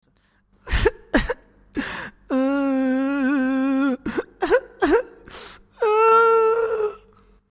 Sound effects > Human sounds and actions
crying male
This man is crying because anything! Anything you can imagine (it can be used for plushy pretend)